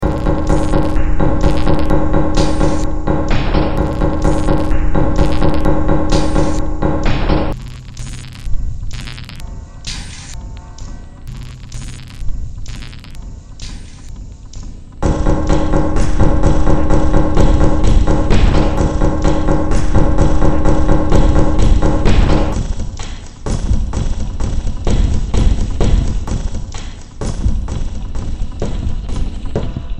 Music > Multiple instruments
Demo Track #3333 (Industraumatic)
Noise, Underground, Games, Cyberpunk, Industrial, Sci-fi, Ambient, Soundtrack, Horror